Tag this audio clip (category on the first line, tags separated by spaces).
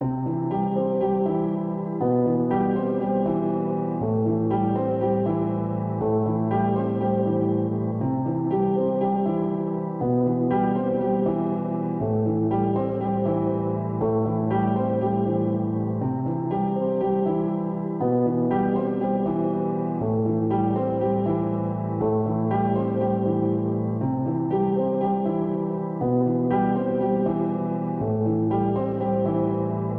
Music > Solo instrument
loop 120bpm 120 simple music reverb pianomusic free piano samples simplesamples